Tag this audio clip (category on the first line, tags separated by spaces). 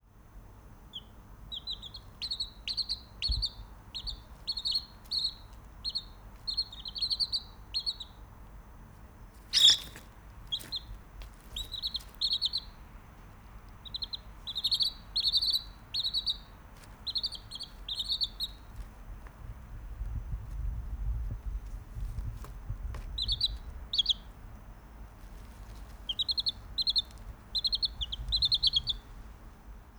Sound effects > Animals
australia backyard bird birdcall birds birdsong brisbane field-recording nature queensland sandpiper spring suburban urban wood